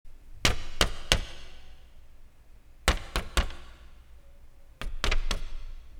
Sound effects > Objects / House appliances
Tapping Paper, light ambient reverb
Tapping a single sheet of paper, added ambient reverb. Made for an immersive story.